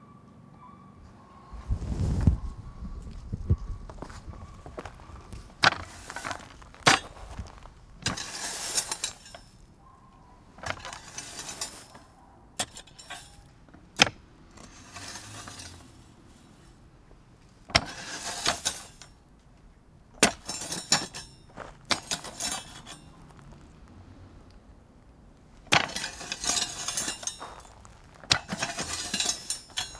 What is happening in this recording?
Sound effects > Human sounds and actions
un rastrillo en la tierra - a rake moving land
garden, work, tool